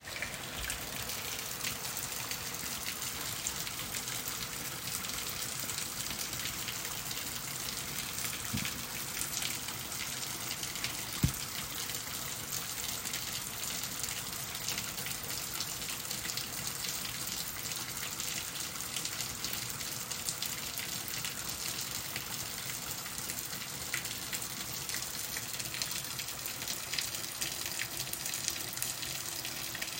Soundscapes > Nature
Autumn downpour log-cabin Axelfors part 2
Autumn downpour on the wood terrace at log-cabin deep in the forest just outside Axelfors Sweden. Stream-flow. Close to motorway. On a windy day. Sound of rain-drops from the tile rooftop. Original field-recording.
Autumn, backwoods, downpour, field-recording, forest, log-cabin, nature, rain, rain-drops, rainstorm, rooftop, Scandinavia, Sweden, torrent, wilderness, windy, woodlands